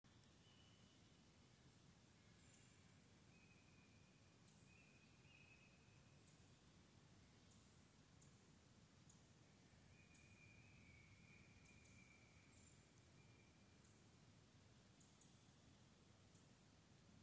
Soundscapes > Nature
Distant Birds
Birds singing in the distant canopy of Prairie Creek Redwoods State Park in California. Early October.